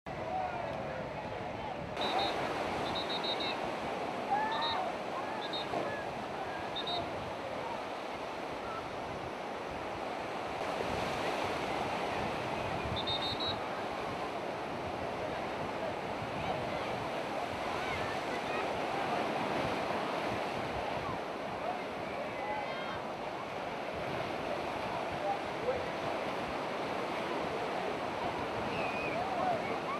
Sound effects > Other
Beach front sounds In Durban
Recorded on Durban beach front on a busy summers day. people swimming people on the beach life guards whistle
Beach, LifeGaurds, Ocean, Sea